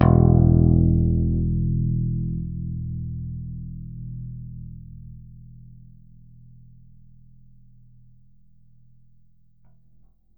Instrument samples > String
E1 string picked on a Squire Strat converted Bass. Static reduced with Audacity.